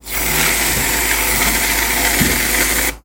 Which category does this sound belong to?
Sound effects > Objects / House appliances